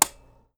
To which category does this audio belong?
Sound effects > Objects / House appliances